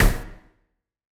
Sound effects > Electronic / Design
Another sound for guns in my project that I made, sharing it here
Gun, Laser